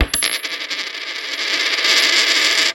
Sound effects > Objects / House appliances
OBJCoin-Blue Snowball Microphone, CU Nickel, Drop, Spin 05 Nicholas Judy TDC
A nickel dropping and spinning.